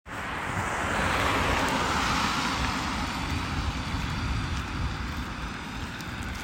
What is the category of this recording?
Sound effects > Vehicles